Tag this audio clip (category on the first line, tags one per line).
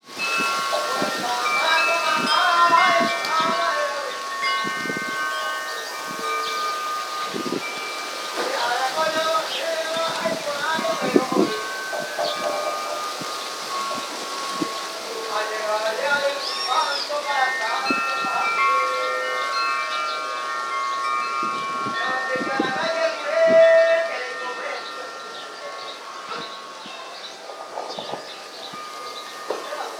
Soundscapes > Urban
america
asuncion
birds
chime
field
guarani
neighborhood
paraguay
recording
south